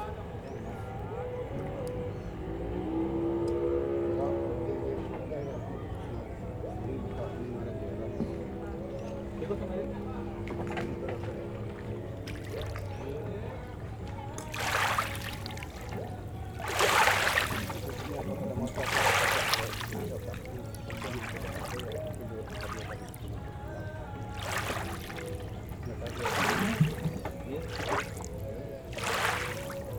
Soundscapes > Nature
Ambiência. Náutico, margem do rio, perspectiva próxima, pescadores, português, ticando peixe, água batendo no casco do barco, música de brega, motos, barcos passando, vozerio, pássaros, crianças brincando, latidos de cachorro. Gravado no Porto do Padre, Novo Airão, Amazonas, Amazônia, Brasil. Gravação parte da Sonoteca Uirapuru. Em stereo, gravado com Zoom H6. // Sonoteca Uirapuru Ao utilizar o arquivo, fazer referência à Sonoteca Uirapuru Autora: Beatriz Filizola Ano: 2025 Apoio: UFF, CNPq. -- Ambience. Nautical, harbor, river margin, close perspective, fishermen, Portuguese, cutting fish, water lap on boat hull, brega music, motorcycles and boats passing by, walla, birds, children playing, dog bark. Recorded at Parque Nacional de Anavilhanas, Novo Airão, Amazonas, Amazônia, Brazil. This recording is part of Sonoteca Uirapuru. Stereo, recorded with the Zoom H6.
water-lap, amazonia, bark, ambience, nature, dog, sonoteca-uirapuru, harbor, boat, water, field, hull, soundscape, field-recording, brazil, nautical, pass-by